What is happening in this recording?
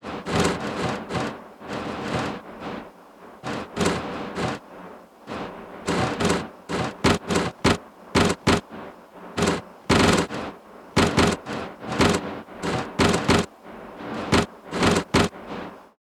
Sound effects > Other mechanisms, engines, machines
Tough Enigne2
Hi! That's not recorded sound :) I synth it with phasephant! Used a sound from Phaseplant Factory: MetalCaseShut1. I put it into Granular, and used distortion make it louder! Enjoy your sound designing day!